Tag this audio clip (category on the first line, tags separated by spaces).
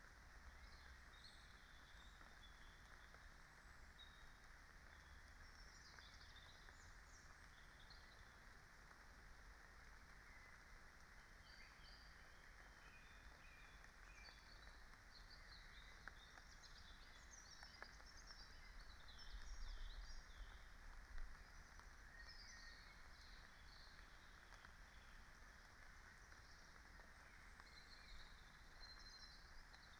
Soundscapes > Nature
phenological-recording natural-soundscape data-to-sound sound-installation artistic-intervention Dendrophone soundscape alice-holt-forest nature weather-data raspberry-pi field-recording modified-soundscape